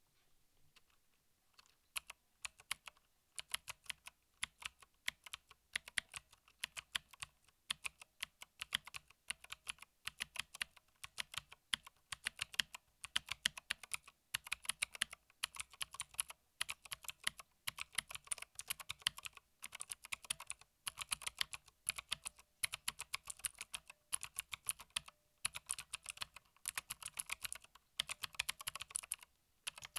Sound effects > Other mechanisms, engines, machines
Slow Methodical Typing
Slow and methodical typing on a mechanical keyboard. Recorded using a Pyle PDMIC-78